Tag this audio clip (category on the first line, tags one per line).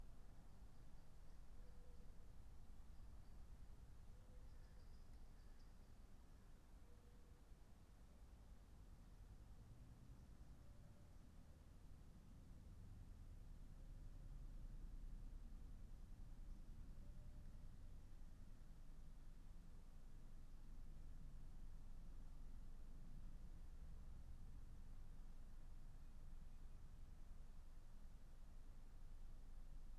Soundscapes > Nature
alice-holt-forest
artistic-intervention
data-to-sound
modified-soundscape
natural-soundscape
nature
raspberry-pi
soundscape
weather-data